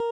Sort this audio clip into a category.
Instrument samples > String